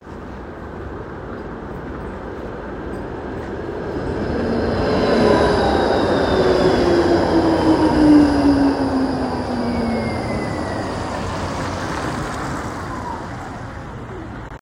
Sound effects > Vehicles
Tram sound
15, iPhone, light, mics, Tram